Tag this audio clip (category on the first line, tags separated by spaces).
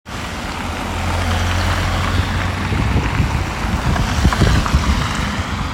Sound effects > Vehicles
automobile car outside vehicle